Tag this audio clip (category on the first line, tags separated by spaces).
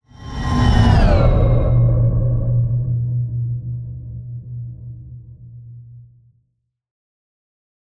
Sound effects > Other
design effect whoosh movement ambient audio film cinematic element trailer sweeping dynamic swoosh sound